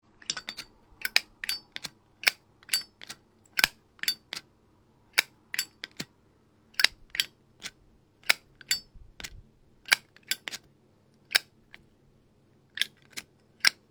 Sound effects > Other mechanisms, engines, machines
Lighter Zippo
opening and striking a classic zippo lighter
click, lighter, spark, cigarette, metallic, ignition, flame, zippo, smoking